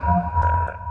Sound effects > Electronic / Design
Digital Interface SFX created using Phaseplant and Portal.